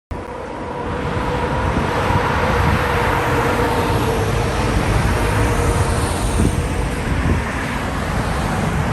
Sound effects > Vehicles
Sun Dec 21 2025 (17)
Truck passing by in highway
highway, road, car